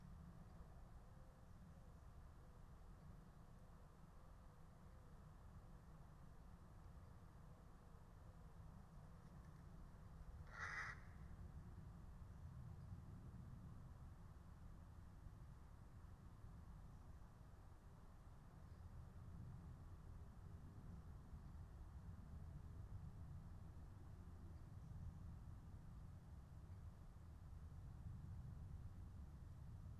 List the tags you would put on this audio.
Soundscapes > Nature
raspberry-pi natural-soundscape meadow soundscape nature field-recording alice-holt-forest phenological-recording

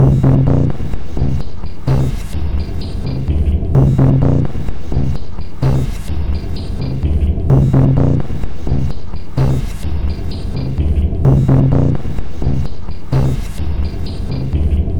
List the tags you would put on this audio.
Instrument samples > Percussion
Drum Soundtrack Loopable Loop

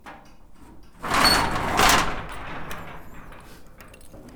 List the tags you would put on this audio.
Sound effects > Objects / House appliances
bonk clunk drill fieldrecording foley foundobject fx glass hit industrial mechanical metal natural object oneshot perc percussion

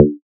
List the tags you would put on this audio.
Instrument samples > Synths / Electronic
additive-synthesis fm-synthesis bass

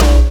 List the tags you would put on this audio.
Instrument samples > Percussion
death drum-loop drum groovy 6x13 metal antipersonocracy death-metal distortion beat sample rhythm

